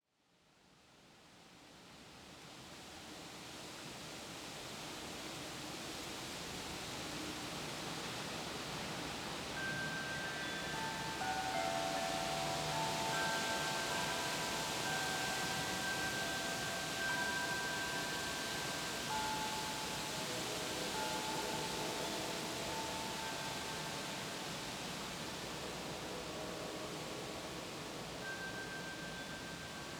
Soundscapes > Nature
A deck recording of a thunderstorm that passed my neck of the woods. Audio begins with the sounds of wind and my deck's windchimes. Soon wind driven rain comes in with some moderate thunder rumbles. The rain eventually tapers off. NOTE: This recording is as-is. No edits. There are some "oddball" sounds here & there. A distant train horn can be heard near the beginning. Some people can be heard in a nearby athletic field along with some distant sounds of trucks on a highway. A landscaper's leaf blower can also be heard before the heavy rain kicks in. Near the end distant volunteer firefighter sirens can be head going off and a commercial jet flies by. Recorded with my usual Zoom H6 Essential recorder. Sound edited in AVS audio software.